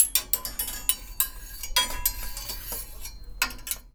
Sound effects > Objects / House appliances
Junkyard Foley and FX Percs (Metal, Clanks, Scrapes, Bangs, Scrap, and Machines) 91
scrape, Robot, Smash, dumpster, Metallic, waste, Robotic, Junk, Metal, Clank, Environment, Percussion, Ambience, Foley, rubbish, dumping, FX, SFX, Clang, rattle, Bang, garbage, Atmosphere, Machine